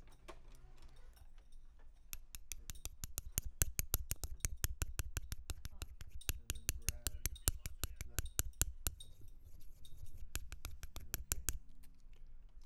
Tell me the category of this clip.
Soundscapes > Indoors